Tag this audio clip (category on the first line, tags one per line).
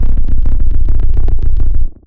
Instrument samples > Synths / Electronic
bass
bassdrop
clear
low
lowend
stabs
subs
synth